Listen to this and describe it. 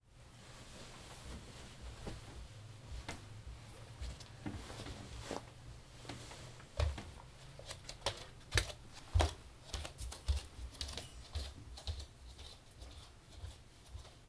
Sound effects > Human sounds and actions
Getting out of bed and stepping with bare feet on the panels. Recording by ZOOM H2n
steps, bare, feet, footsteps, bed